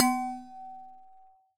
Sound effects > Objects / House appliances
Resonant coffee thermos-012
percusive, recording, sampling